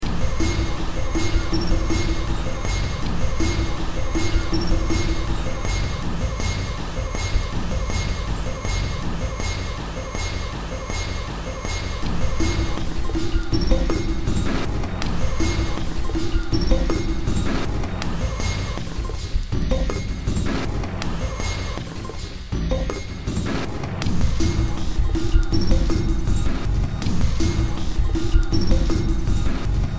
Music > Multiple instruments
Short Track #3081 (Industraumatic)
Horror, Soundtrack, Sci-fi, Cyberpunk, Underground, Noise